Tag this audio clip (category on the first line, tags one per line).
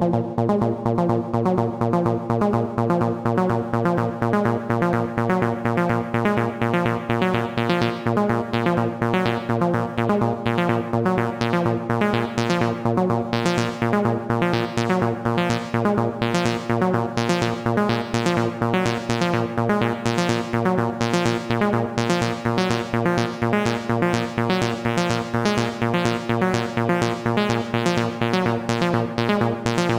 Music > Solo instrument
house
Recording
techno
Acid
electronic
synth
Roland
303
hardware
TB-03